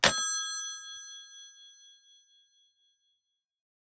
Sound effects > Objects / House appliances
Phone Handset Slam
A recording of slamming the handset down on a Western Electric Model 500 rotary phone from the late 1950s. Supposed to sound like hanging up.